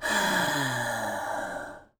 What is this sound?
Sound effects > Human sounds and actions
HMNBrth-Blue Snowball Microphone, CU Sigh Nicholas Judy TDC
Blue-brand, Blue-Snowball, breath, human, sigh